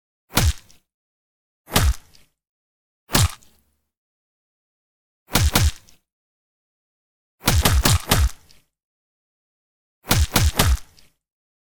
Sound effects > Objects / House appliances
TMNT 2012 inspired punch with bones cracking 05302025 FINAL
custom tmnt 2012 inspired punching sounds with bone breaking sound.
karate, impact, battle, crack, combat, brawler, bones, break, bradmyers, hit, decking, TMNT, body, fighter, jeffshiffman, punch, flesh, kick, kicking, martialarts, fight, fist, boxing, attack, brawl, crunch, punching, fighting, kung-fu